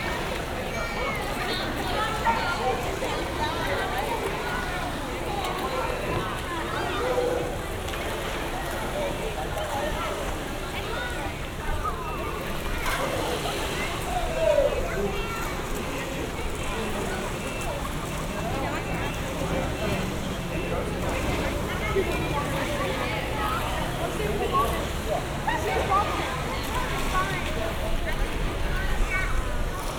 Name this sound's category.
Soundscapes > Urban